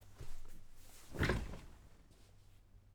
Sound effects > Objects / House appliances
Man falls on old sofa. Recorded with M-Audio M-TRACK II and pair of Soyuz 013 FET mics.